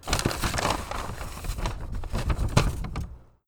Sound effects > Objects / House appliances
Rummaging through a paper bag.